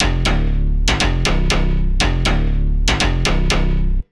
Music > Solo instrument
120bpm - DeepBass CMajor - Master
Original Version of The Crow DeepBass. Also with lots of reverb!
Electro, House, Deep, Bass